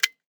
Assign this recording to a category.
Sound effects > Human sounds and actions